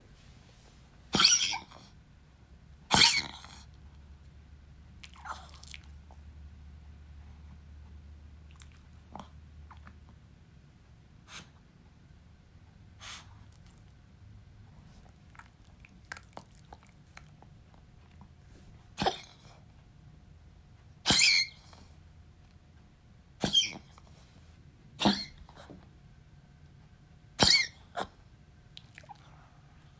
Sound effects > Animals
Small Dog wheezing & coughing
Small dog wheezing, coughing, licking and breathing. 15-year old Chihuahua/Poodle mix.
animal, bark, cough, coughing, dog, licking, pet, wheezing